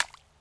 Sound effects > Natural elements and explosions
Small Puddle Splash
Stepping on a small puddle after it rained.
puddle, small, splash, step, water, wet